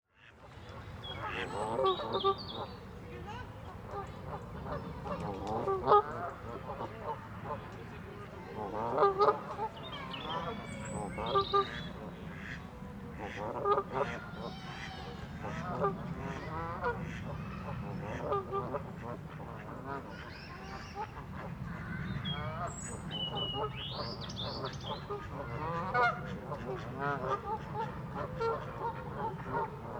Soundscapes > Nature
A morning recording at Wolseley Nature Reserve, Staffordshire. Zoom H6 Studio, Mono. XY Mics.